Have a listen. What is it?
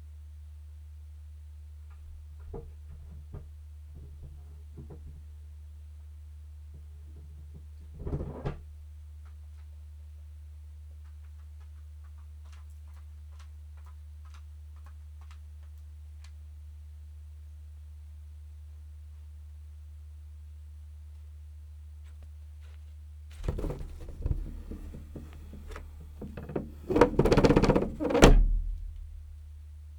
Sound effects > Animals
Cat-flap 3
Subject : A cat flap. it's in between two other doors/cat flaps the cats need to go though. Date YMD : 2025 September 04 Location : Gergueil 21410 Bourgogne-Franche-Comté Côte-d'Or France. Hardware : DJI Mic 3 TX. Onboard recorder "Original" / raw mode. Weather : Processing : Trimmed and normalised in Audacity.
Dji-mic3, cat, omni, 21410, cat-flap, France